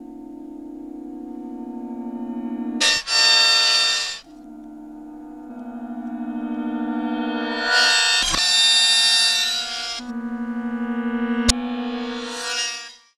Sound effects > Objects / House appliances
A collection of sounds made banging and scratching a broken violin